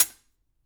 Sound effects > Other mechanisms, engines, machines
metal shop foley -175
bam, bang, boom, bop, crackle, foley, fx, knock, little, metal, oneshot, perc, percussion, pop, rustle, sfx, shop, sound, strike, thud, tink, tools, wood